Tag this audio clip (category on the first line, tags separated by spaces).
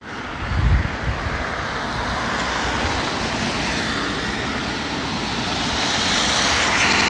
Sound effects > Vehicles
car road tire